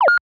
Sound effects > Electronic / Design
GLOSSY ARTIFICIAL CRASH BLEEP
BEEP, HIT, DING, UNIQUE, INNOVATIVE, CIRCUIT, BOOP, ELECTRONIC, CHIPPY, SHARP, COMPUTER, OBSCURE, SYNTHETIC, EXPERIMENTAL, HARSH